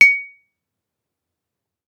Sound effects > Other mechanisms, engines, machines
Bottle Clink 02
bottle, garage, sample